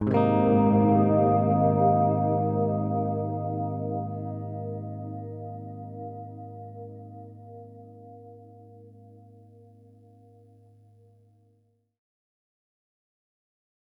Instrument samples > String
Baritone Guitar - G# Chord 2 - Reverb
Simple chord played on my G4M electric baritone guitar that is tuned in C. Recorded with Dreadbox Raindrops effects pedal on Zoom AMS-24 audio interface (stereo).
GSharp, reverb, guitar, stereo, baritone, chord, electric, wide